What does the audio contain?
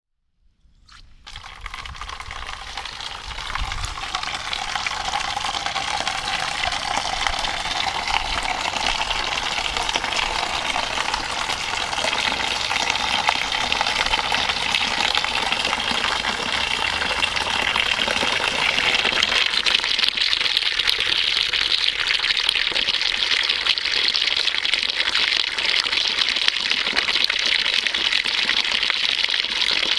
Soundscapes > Urban
Chorro de agua sobre cantaro - El Salvador
Water running and hitting on ceramic in Ecoparque El Espino, La Libertad, El Salvador, next to the volcano of San Salvafdor.
america, central, el, park, salvador, san, water